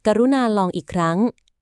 Speech > Solo speech
Please try again
Checkin, Please, again